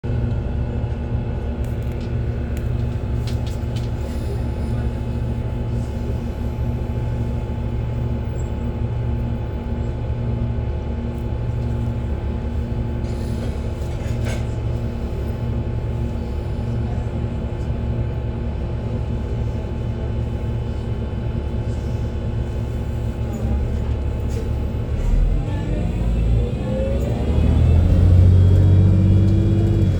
Sound effects > Vehicles
2008 New Flyer D40LFR Transit Bus #1 (MiWay 0814)
I recorded the engine and transmission sounds when riding the Mississauga Transit/MiWay buses. This is a recording of a 2008 New Flyer D40LFR transit bus, equipped with a Cummins ISL I6 diesel engine and Voith D864.5 4-speed automatic transmission. This bus was retired from service in 2025.
bus, cummins, d40, d40lf, d40lfr, driving, engine, flyer, isl, mississauga, miway, new, public, ride, transit, transmission, transportation, truck, voith